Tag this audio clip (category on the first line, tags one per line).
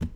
Sound effects > Objects / House appliances
carry
pour